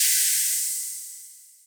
Instrument samples > Percussion
Magical Cymbal11
Synthed only with a preset of the Pacter Plugin in FLstudio Yes,only the preset called '' Cymbalism '' I just twist the knobs a night then get those sounds So have a fun!
Cymbal, Enthnic, FX, Magical, Percussion, Synthtic